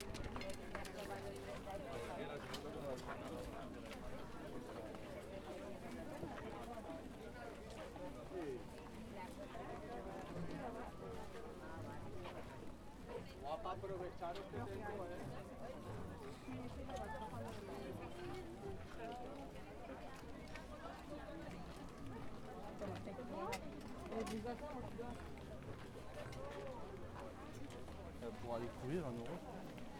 Soundscapes > Urban
Calpe Market 3
spanish, street, people, market, chatter, voices, field-recording, Tascam-DR-40X